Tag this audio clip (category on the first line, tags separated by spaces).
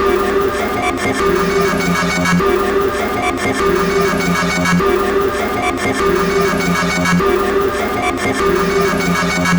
Instrument samples > Percussion
Dark Soundtrack Ambient Industrial Drum